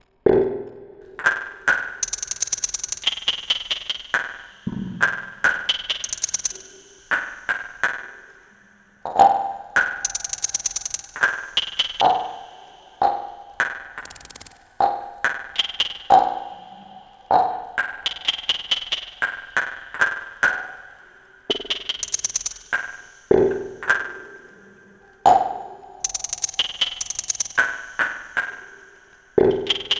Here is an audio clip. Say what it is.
Soundscapes > Synthetic / Artificial
Synthetic crickets talking to each other in the night Produced with Torso S4